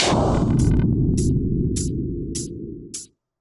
Sound effects > Electronic / Design
Impact Percs with Bass and fx-036
From a collection of impacts created using a myriad of vsts and samples from my studio, Recorded in FL Studio and processed in Reaper